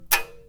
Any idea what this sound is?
Sound effects > Other mechanisms, engines, machines
Handsaw Oneshot Metal Foley 26
foley, fx, handsaw, hit, household, metal, metallic, perc, percussion, plank, saw, sfx, shop, smack, tool, twang, twangy, vibe, vibration